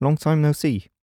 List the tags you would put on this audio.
Speech > Solo speech
2025 Adult Calm FR-AV2 Generic-lines Greeting Hypercardioid july long-time-no-see Male mid-20s MKE-600 MKE600 Sennheiser Shotgun-mic Shotgun-microphone Single-mic-mono Tascam VA Voice-acting

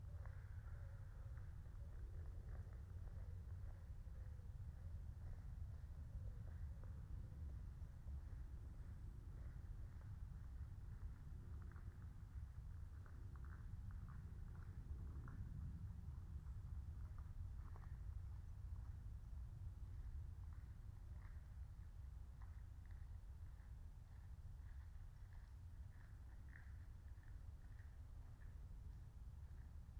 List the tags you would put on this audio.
Soundscapes > Nature
field-recording meadow raspberry-pi natural-soundscape phenological-recording alice-holt-forest soundscape nature

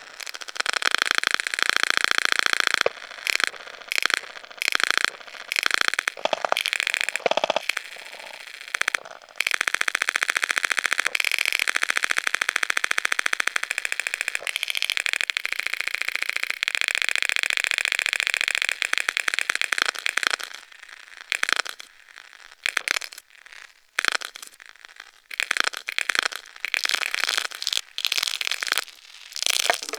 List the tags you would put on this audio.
Sound effects > Electronic / Design
crackle dust microsound Morphagene noise plastic-toy surface tactile

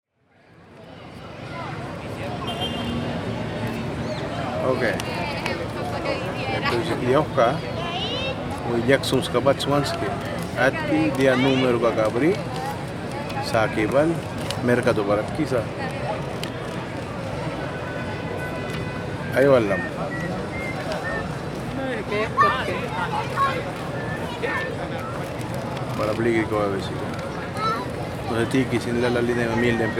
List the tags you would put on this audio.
Speech > Conversation / Crowd
ancestral dialect

